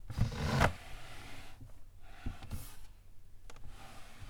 Sound effects > Objects / House appliances
Wooden Drawer 06
drawer, open, wooden